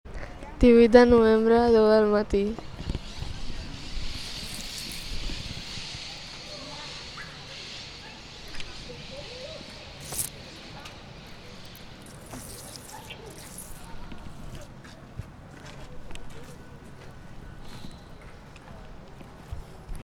Soundscapes > Urban
20251118 CasaBloc MiaRosalia
Urban Ambience Recording in collab IE Tramunta, Barcelona, Novembre 2025. Using a Zoom H-1 Recorder. In the context of "Iteneraris KM.0" Project.
Ambience, Urban, SoundMap